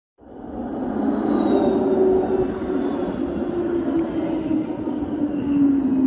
Soundscapes > Urban
final tram 16
Tram Sound captured on iphone 15 Pro.
tram, finland, hervanta